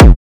Instrument samples > Percussion
Phonk Kick 4
Retouched FPC Kick 2 from Flstudio original sample pack with FLstudio sampler, I just twisted pogo amount and did some distortion for it. Also tweak pitch and mul amount in Flstudio sampler too. Processed with Edison and ZL EQ. Added a Waveshaper in master channel to prevent it over loudness.